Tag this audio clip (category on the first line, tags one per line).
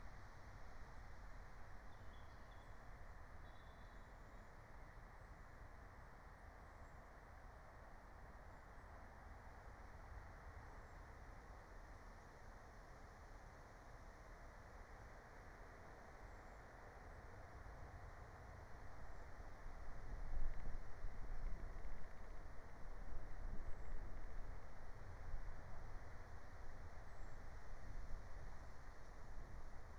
Soundscapes > Nature
alice-holt-forest
field-recording
natural-soundscape
nature
phenological-recording
raspberry-pi
soundscape